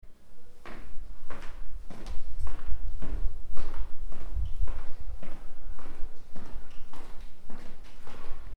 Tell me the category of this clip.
Sound effects > Human sounds and actions